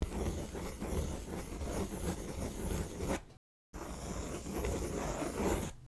Sound effects > Objects / House appliances
Pencil scribble long
Pencil scribbles/draws/writes/strokes for a long amount of time.